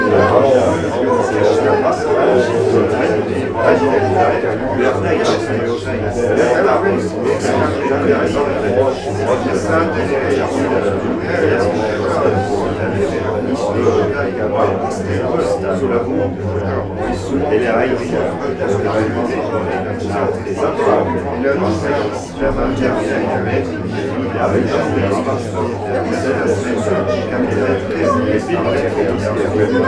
Speech > Conversation / Crowd
Mumbo Jumbo bus 1 and 2
XY, Rode, Tascam, solo-crowd, crowd, NT5, processed, FR-AV2, indoor, mixed, mumbo-jumbo